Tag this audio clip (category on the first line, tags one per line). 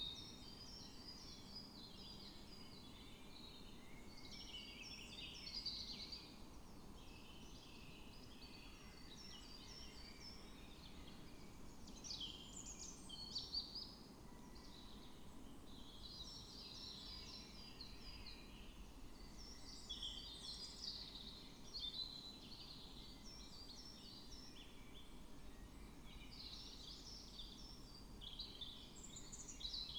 Soundscapes > Nature
weather-data
nature
soundscape
alice-holt-forest
Dendrophone
natural-soundscape
data-to-sound
sound-installation
field-recording
raspberry-pi
modified-soundscape
artistic-intervention
phenological-recording